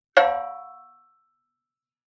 Sound effects > Other mechanisms, engines, machines
Dewalt 12 inch Chop Saw foley-036
Blade, Chopsaw, Circularsaw, Foley, FX, Metal, Metallic, Perc, Percussion, Saw, Scrape, SFX, Shop, Teeth, Tool, Tools, Tooth, Woodshop, Workshop